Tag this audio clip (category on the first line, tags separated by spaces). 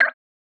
Sound effects > Objects / House appliances
drip water drop pipette